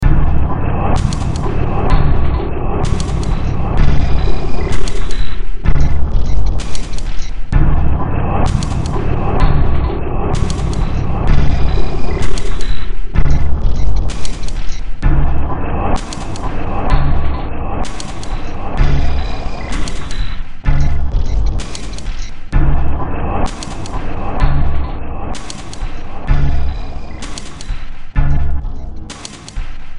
Music > Multiple instruments
Demo Track #3313 (Industraumatic)
Games, Ambient, Underground, Noise, Cyberpunk, Horror, Industrial, Soundtrack, Sci-fi